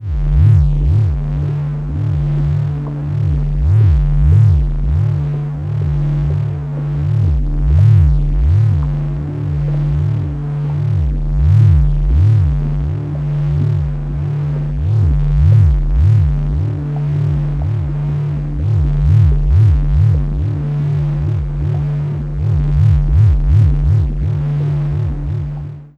Soundscapes > Synthetic / Artificial
Low-frequency hum pushed by energetic, irregular pulses, while droplets of static energy pop through.
energy, force, humming, field, engine, scifi